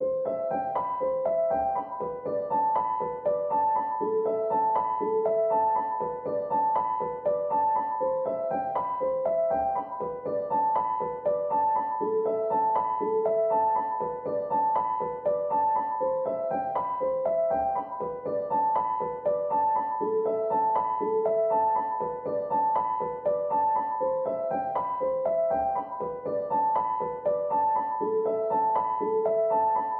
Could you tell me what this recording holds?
Music > Solo instrument
Piano loops 192 octave up short loop 120 bpm
120, music, simple, 120bpm, simplesamples, samples, pianomusic, reverb, piano, loop, free